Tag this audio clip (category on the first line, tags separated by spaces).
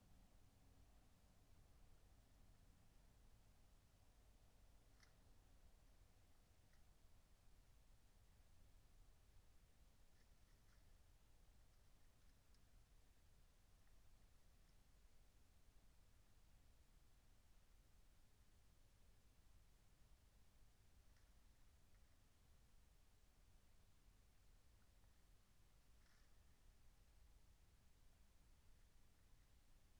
Soundscapes > Nature
meadow nature phenological-recording